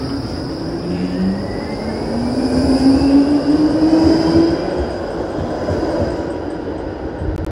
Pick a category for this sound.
Sound effects > Vehicles